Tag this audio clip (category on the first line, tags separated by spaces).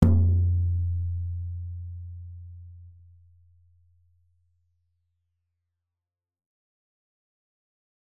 Music > Solo percussion
acoustic beat beatloop beats drum drumkit drums fill flam floortom instrument kit oneshot perc percs percussion rim rimshot roll studio tom tomdrum toms velocity